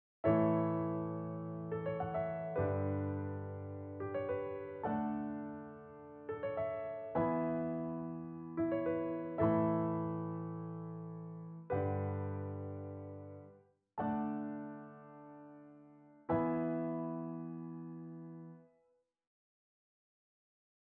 Music > Solo instrument
back to school piano
addictive keys with d verb piano. free use.
jingle, keys, piano, sample